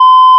Sound effects > Electronic / Design
1025 hz beep censor
A beep censor being one of the most popular sounds here, I decided to make my own for internet approval points. Made in Audacity by generating a 1000hz sinewave tone, filtering it a bit applied distortion speeded up by 1.025x. Applied some fade in/out so it wouldn't click.